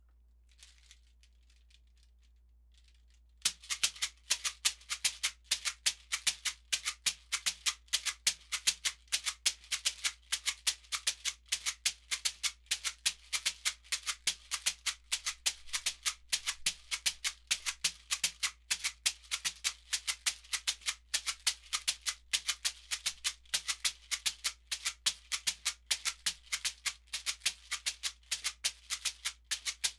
Music > Solo instrument
Agbe - Shekere - Afoxe - Ijexa - 100 BPM
"Agbê / shekere" recorded for a song in the ijexá (or afoxé) rhythm, produced in northeastern Brazil.
100-bpm, percussion